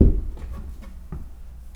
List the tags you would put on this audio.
Sound effects > Objects / House appliances
bonk
clunk
drill
fx
glass
hit
natural
oneshot
percussion
sfx

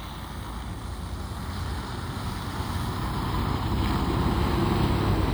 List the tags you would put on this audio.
Sound effects > Vehicles
engine
bus
vehicle

